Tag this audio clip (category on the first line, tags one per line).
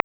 Sound effects > Electronic / Design
glitch
clicks
electronic